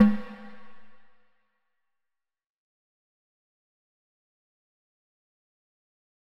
Music > Solo percussion
Snare Processed - Oneshot 219 - 14 by 6.5 inch Brass Ludwig
snare drum 14 by 6.5 inch brass ludwig recorded in the soundproofed sudio of Calupoly Humboldt with an sm57 and a beta 58 microphone into logic and processed lightly with Reaper
acoustic, beat, brass, crack, drum, drumkit, drums, flam, fx, hit, hits, kit, ludwig, oneshot, perc, percussion, processed, realdrum, realdrums, reverb, rim, rimshot, rimshots, roll, sfx, snare, snaredrum, snareroll, snares